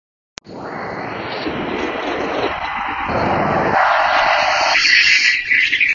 Soundscapes > Urban
Where: Tampere Keskusta What: Sound of a bus passing by Where: At a bus stop in the morning in a mildly windy weather Method: Iphone 15 pro max voice recorder Purpose: Binary classification of sounds in an audio clip